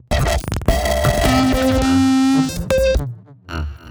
Sound effects > Electronic / Design
Optical Theremin 6 Osc Destroyed-004
Alien, Analog, Bass, Digital, DIY, Dub, Electro, Electronic, Experimental, FX, Glitch, Glitchy, Handmadeelectronic, Infiltrator, Instrument, Noise, noisey, Optical, Otherworldly, Robot, Robotic, Sci-fi, Scifi, SFX, Spacey, Sweep, Synth, Theremin, Theremins, Trippy